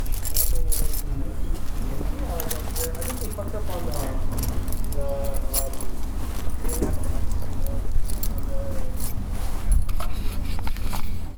Sound effects > Objects / House appliances
Junkyard Foley and FX Percs (Metal, Clanks, Scrapes, Bangs, Scrap, and Machines) 175
Ambience, Bash, Clank, dumpster, Foley, garbage, Junk, Metallic, Percussion, Robot, Smash, tube